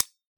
Sound effects > Other mechanisms, engines, machines
When it's upside-down the switch reproduce a slightly different sound, a bit dryer and with a shorter release time. There are also samples in the pack that attend the antithesis. Please follow my socials, don't be rude..